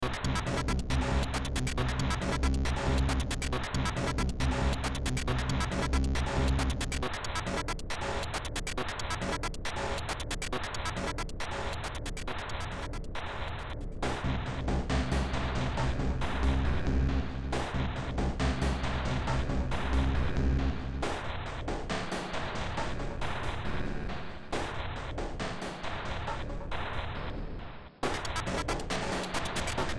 Music > Multiple instruments
Short Track #2968 (Industraumatic)
Games, Sci-fi, Noise, Ambient, Cyberpunk, Horror, Industrial, Underground, Soundtrack